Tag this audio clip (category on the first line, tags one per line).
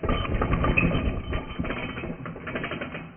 Sound effects > Experimental
door
rattle
chain
sfx
open
videogame